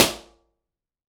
Soundscapes > Other
I&R Livingroom Esperaza - ECM999
Subject : An Impulse and response (not just the response.) of my old living room in Esperaza. Date YMD : 2025 July 11 Location : Espéraza 11260 Aude France. Recorded with a Superlux ECM 999 and Soundman OKM1 Weather : Processing : Trimmed in Audacity.
11260, ballon, balloon, convolution, Convolution-reverb, ECM999, Esperaza, FR-AV2, FRAV2, Impulse, Impulseandresponse, IR, living-room, omni, pop, Response, Reverb, Tascam